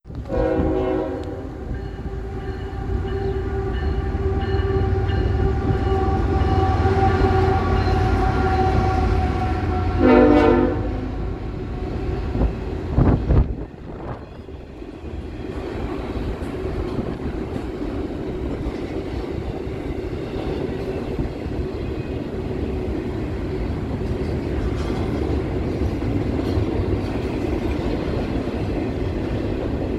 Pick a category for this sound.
Sound effects > Vehicles